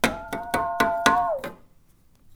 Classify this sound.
Sound effects > Other mechanisms, engines, machines